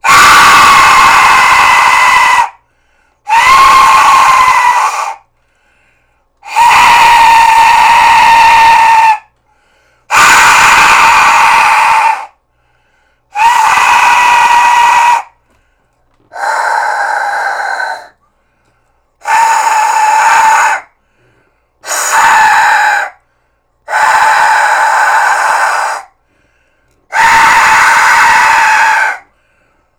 Sound effects > Objects / House appliances
WHSTMech-Blue Snowball Microphone, CU Aztec Death Whistle Nicholas Judy TDC
An aztec death whistle.